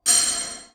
Sound effects > Other
spell ice c
16 - Strong Ice Spells Foleyed with a H6 Zoom Recorder, edited in ProTools